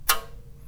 Sound effects > Other mechanisms, engines, machines
Handsaw Oneshot Metal Foley 28
foley, fx, handsaw, hit, household, metal, metallic, perc, percussion, plank, saw, sfx, shop, smack, tool, twang, twangy, vibe, vibration